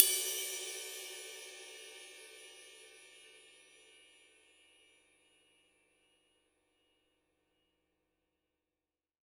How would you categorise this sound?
Music > Solo instrument